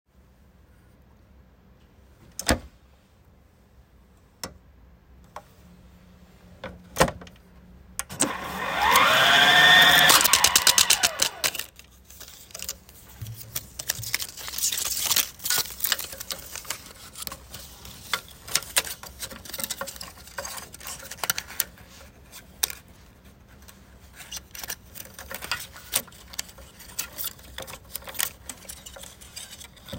Sound effects > Other mechanisms, engines, machines
All sounds associated with a 35mm plastic microfilm scanner being loaded with microfilm, cranked, fast-forwarded and rewound. Actual research of vintage newspapers at a local library being performed for the recording.